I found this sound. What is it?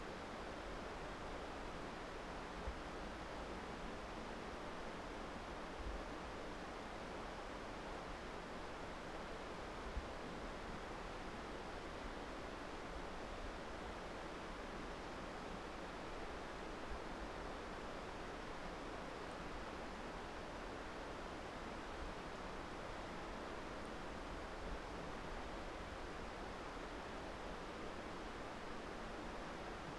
Soundscapes > Urban
Subject : Recording the Church bell of Esperaza form the bins area. Sennheiser MKE600 with stock windcover P48, no filter. Weather : Clear sky, little wind. Processing : Trimmed in Audacity.